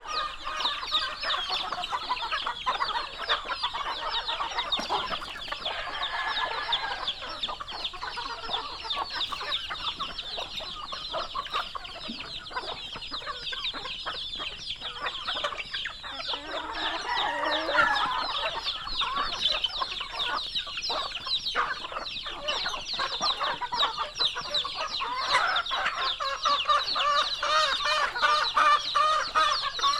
Sound effects > Animals
250719 084648 PH Roosters chickens and chicks outdoor
Roosters, chickens and chicks, outdoor. Recorded in the surroundings of Santa Rosa (Baco, Oriental Mindoro, Philippines)during July 2025, with a Zoom H5studio (built-in XY microphones). Fade in/out applied in Audacity.
chicken, atmosphere, rooster, field-recording, farm, chick, feeding, chickens, soundscape, poultry, outdoor, Philippines, countryside, Santa-Rosa, roosters, feed, chicks, ambience